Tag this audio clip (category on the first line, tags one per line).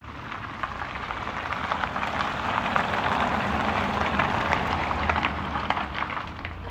Sound effects > Vehicles
driving
electric
vehicle